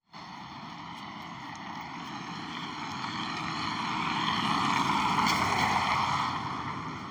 Sound effects > Vehicles
car passing 16
car, vehicle